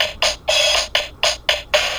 Sound effects > Objects / House appliances
TOYElec-Blue Snowball Microphone, CU Drum Rhythm, Looped 03 Nicholas Judy TDC
An electronic drum rhythm loop.